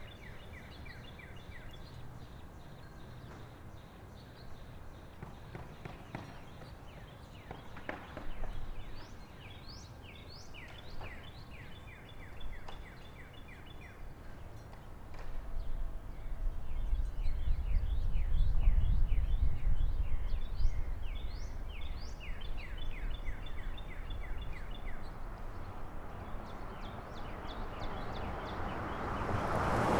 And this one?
Soundscapes > Urban
AMBSubn-Spring Madisonville Neighborhood Midday with traffic QCF Cincinnati ZoomH4n
Neighborhood Afternoon with passing traffic